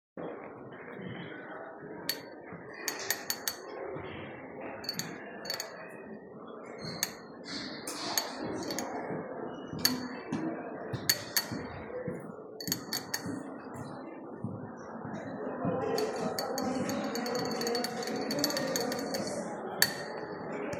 Sound effects > Objects / House appliances
Fingernails clicking an old metallic doorknob with filtered speech and ambience. Recorded on Honor 200 Smart.
metal, filter, touch